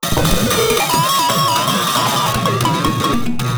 Sound effects > Electronic / Design
Optical Theremin 6 Osc Destroyed-001
Alien, Analog, Digital, DIY, Electronic, Glitch, Handmadeelectronic, Optical, Otherworldly, Scifi, Sweep, Synth